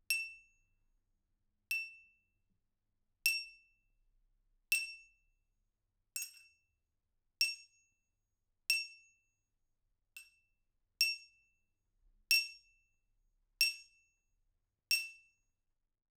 Sound effects > Other
Glass applause 37
Tascam, wine-glass, single, FR-AV2, cling, stemware, solo-crowd, glass, person, XY, applause, clinging, indoor, individual, Rode, NT5